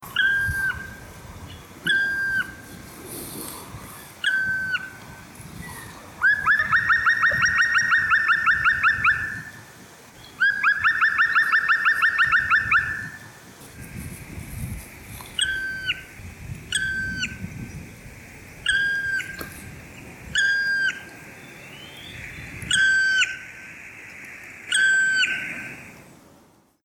Soundscapes > Nature
A Black Woodpecker (Dryocopus martius) in an Austrian Wood (Burgenland) with different calls (warning) in the summer.